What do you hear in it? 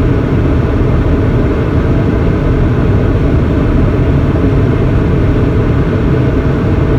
Sound effects > Objects / House appliances
Air Return Vent (loop)
Recorded an air return vent with my MKH 60 shotgun mic into a Zoom F3. Got up as close to it as I could. Minimally EQ'd and processed, and made to loop seamlessly.
blowing, shotgun, mkh-60, home, cool, vent, f3, warm, conditioning, blow, air-conditioning, hum, house, cold, ac, air, fan, hvac, wind, loop, zoom, furnace, mkh